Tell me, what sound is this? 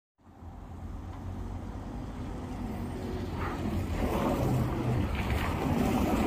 Sound effects > Vehicles
final bus 1
bus, hervanta, finland